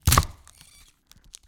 Sound effects > Experimental
Torturing onions. Can be useful to design punch sounds. Recorded with Oktava MK 102.